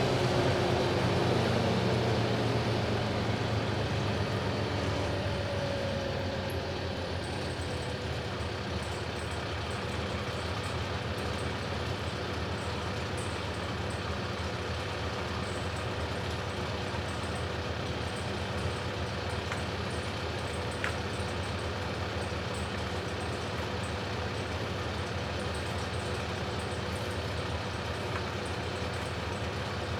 Soundscapes > Other
Hand-fed wood chipper idle and shutoff
A Bandit Intimidator 12XP hand-fed towable wood chipper idles in front of a residence in the Riverdale neighbourhood of Whitehorse, Yukon. When the chipper shuts off at around the 2:15 mark, the sound of a metal rake on a concrete sidewalk and asphalt road can be heard. Recorded on handheld Zoom H2n in stereo on August 26, 2025.
Bandit-intimidator-12XP, chipper, city, engine, field-recording, idle, idling, noise, rakes, raking, riverdale, shut-off, sidewalk, tree-pruning, whitehorse, wood-chipper, yukon